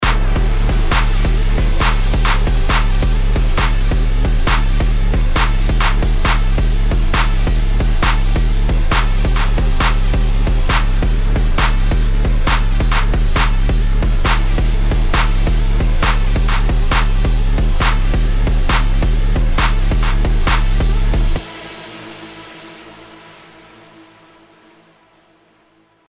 Music > Multiple instruments
Geometric Sprint
A beat that reminds me of Geometry Dash. All original beats, made using Battery and Massive X. 140 bpm. Can be looped if the end is trimmed off.
140bpm, clap, handclap, loop, music, synth